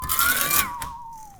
Sound effects > Other mechanisms, engines, machines
Handsaw Pitched Tone Twang Metal Foley 36
foley, fx, handsaw, hit, household, metal, metallic, perc, percussion, plank, saw, sfx, shop, smack, tool, twang, twangy, vibe, vibration